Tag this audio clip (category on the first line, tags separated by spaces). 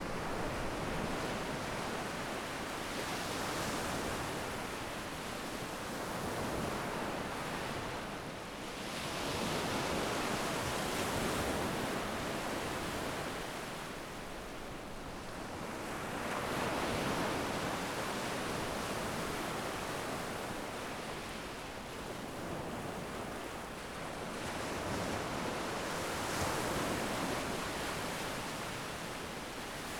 Soundscapes > Nature
Sea Sea-Water-Wave Water Wave